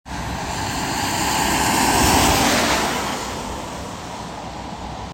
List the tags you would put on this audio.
Sound effects > Vehicles
car field-recording

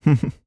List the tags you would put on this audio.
Speech > Solo speech
2025 Adult Calm FR-AV2 Generic-lines huhu july laughing Male mid-20s Shotgun-mic Shotgun-microphone Tascam VA Voice-acting

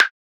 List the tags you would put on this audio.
Instrument samples > Synths / Electronic
fm electronic surge

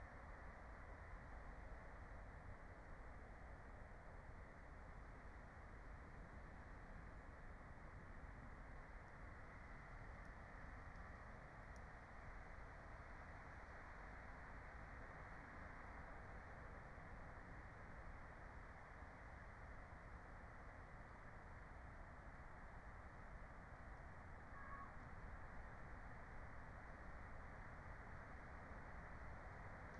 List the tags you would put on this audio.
Soundscapes > Nature
nature phenological-recording meadow field-recording natural-soundscape raspberry-pi alice-holt-forest soundscape

